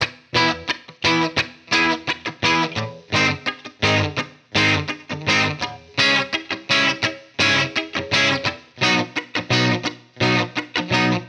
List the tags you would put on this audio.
Music > Solo instrument
85BPM
chord-progression
chords
Dry
electric
electric-guitar
guitar
guitar-progression
loop
progression
reggae
rhythm-guitar
ska
ska-punk
Stratocaster

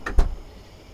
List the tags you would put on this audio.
Sound effects > Other
fireworks-samples
sfx
day
explosions
United-States
electronic
free-samples
america
sample-packs
samples
patriotic
experimental
independence
fireworks

